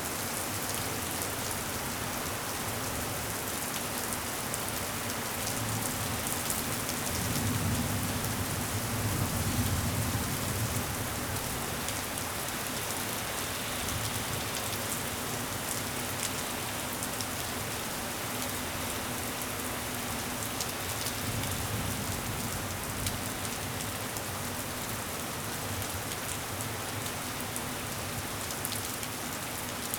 Sound effects > Natural elements and explosions
Rain (moderate)
Moderate rain and some thunder in the back. Recorded in springtime in the north of Italy
thunderstorm, field-recording, rain, nature